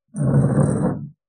Sound effects > Objects / House appliances
Moving a chair
Dragging a chair on the ground. Recorded with phone mobile device NEXG N25
Chair, Drag, Dragged, Left, Move, Moving, Pull, pulling, Push, Pushed, Transfer